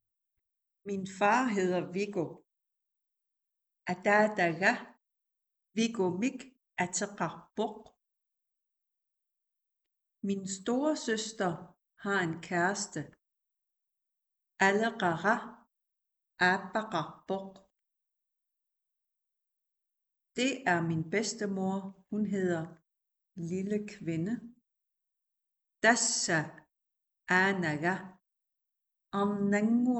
Speech > Solo speech
Sætninger med familie komp 1 si 12
Greenlandic sentences describing family: 1. My fathers name is Viggo / Ataataga Viggomik ateqarpoq 2. My big sister (you being a boy) has a boyfriend / Aleqara aappaqarpoq 3. This is my grandmother. Her name is Arnannguaq (little woman) / Tassa aanaga. Arnannguarmik ateqarpoq. 4. My mother works in the school / Anaanaga atuarfimmi sulisarpoq. 5. My little brother (you being a boy) played soccer yesterday / Nukaga ippassaq arsarpoq 6. Monday my grandfather went shopping / Aataga ataasinngormat pisiniarpoq. 7. Tuesday my big brother (you being a girl) cycled to school. / Aniga marlunngormat atuarfimmut sikkilerpoq 8. My little sister (you being a boy) is cute / Najaga inequnarpoq. / Nukaga (if you are her big sister).
family, Greenlandic, familynames, sentences